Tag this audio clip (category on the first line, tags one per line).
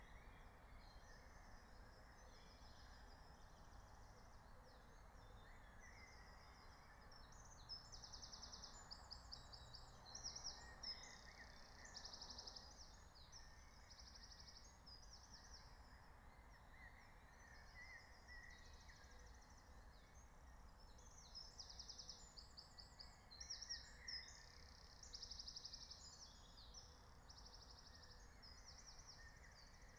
Soundscapes > Nature
raspberry-pi
meadow
alice-holt-forest
phenological-recording
field-recording
nature
soundscape
natural-soundscape